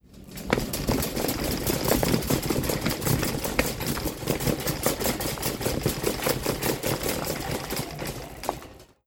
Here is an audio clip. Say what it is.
Sound effects > Objects / House appliances
OBJWhled-Samsung Galaxy Smartphone, CU Shopping Cart Rolling, Fast Nicholas Judy TDC
A fast shopping cart rolling. Recorded at Lowe's.